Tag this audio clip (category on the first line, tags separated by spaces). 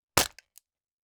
Sound effects > Objects / House appliances
FR-AV2 Sennheiser metallic Stump Sodacan stepping Soda 33cl Tascam Stumping aluminium-can flat crushing fast fast-crush aluminium Soda-can Can tall compacting 33cl-tall MKE600 empty stomp metal